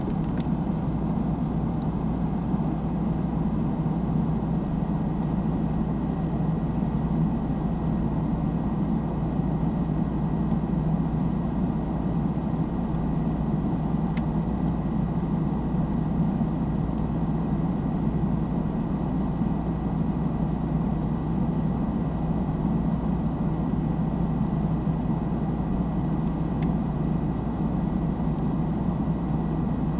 Soundscapes > Other
inside of my freezer
sounds like a delta flight own sound recorded with iphone 16 pro voice memos app
freezer, fridge, ice